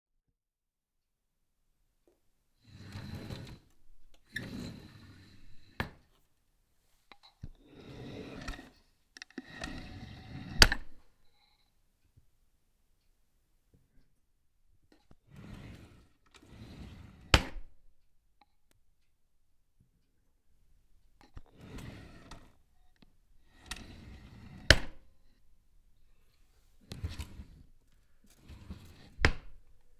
Sound effects > Objects / House appliances
Opening and closing wooden kitchen draws with sliders.
close, closing, draw, kichen, open, opening, sfx, wood